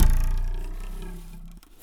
Sound effects > Other mechanisms, engines, machines
metal shop foley -132
percussion, thud, pop, metal, bang, wood, sound, crackle, bop, bam, little, boom, tools, knock, tink, sfx, shop, perc